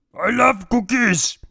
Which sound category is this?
Speech > Solo speech